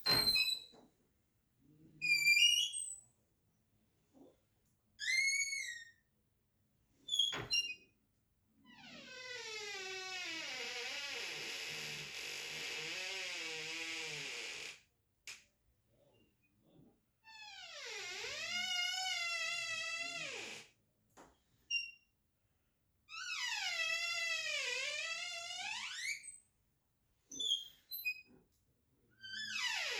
Sound effects > Objects / House appliances
DOORCreak-Samsung Galaxy Smartphone Master Bathroom, Squeaks Nicholas Judy TDC
Master bathroom door squeaking and creaking.